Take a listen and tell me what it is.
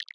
Instrument samples > Percussion
Organic-Water Snap 7
Glitch, EDM, Snap, Botanical, Organic